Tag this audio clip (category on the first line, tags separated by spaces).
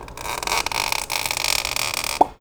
Sound effects > Objects / House appliances
Blue-brand Blue-Snowball cartoon pop stretch